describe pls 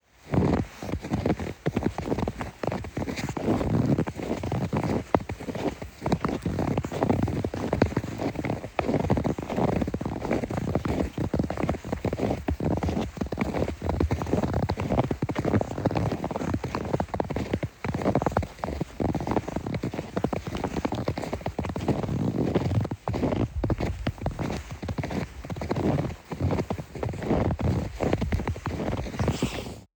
Sound effects > Human sounds and actions
Walking in the snow - two persons - 2026-01 Bilthoven HZA
Sound of two people walking through a thick layer of snow. iPhone 6 stereo recording.
footsteps, snow, walking, shoes, boots, walk